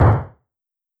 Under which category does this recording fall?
Sound effects > Human sounds and actions